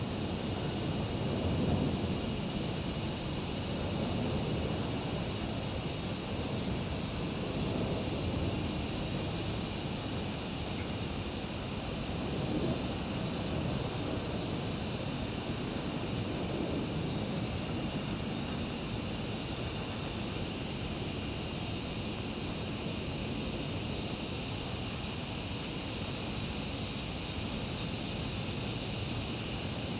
Soundscapes > Nature
Cicadas in Nakameguro, Tokyo
I recorded really loud cicadas around the Meguro River from the window of my office in Nakameguro. You can also hear distant traffic.
cicadas, field-recording, insect, japan, summer, tokyo